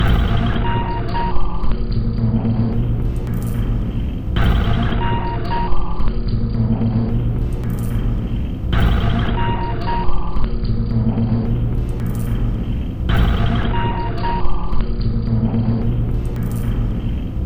Instrument samples > Percussion
This 110bpm Horror Loop is good for composing Industrial/Electronic/Ambient songs or using as soundtrack to a sci-fi/suspense/horror indie game or short film.
Alien, Ambient, Dark, Industrial, Loop, Loopable, Packs, Samples, Soundtrack, Underground, Weird